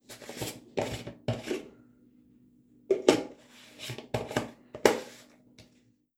Sound effects > Objects / House appliances
PLASHndl-Samsung Galaxy Smartphone, MCU Jar, Twist Lid, Open, Close Nicholas Judy TDC
A jar twisting lid open and close.
jar, open, close, twist, Phone-recording, lid, foley